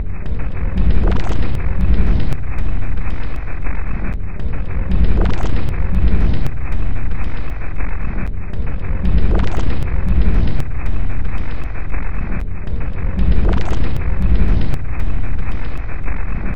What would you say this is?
Instrument samples > Percussion
This 116bpm Drum Loop is good for composing Industrial/Electronic/Ambient songs or using as soundtrack to a sci-fi/suspense/horror indie game or short film.
Alien, Ambient, Dark, Drum, Industrial, Loop, Loopable, Packs, Samples, Soundtrack, Underground, Weird